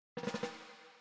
Music > Solo percussion
Snare Processed - Oneshot 77 - 14 by 6.5 inch Brass Ludwig
acoustic, beat, brass, drum, drumkit, drums, flam, fx, ludwig, oneshot, perc, percussion, processed, realdrum, realdrums, rim, rimshot, roll, sfx, snaredrum, snareroll